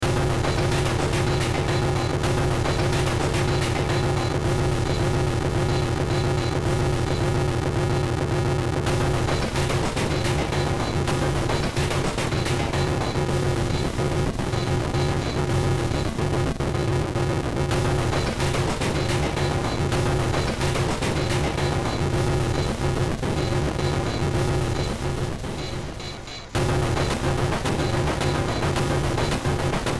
Music > Multiple instruments
Short Track #3131 (Industraumatic)
Ambient, Sci-fi, Noise, Games, Cyberpunk, Underground, Industrial, Horror, Soundtrack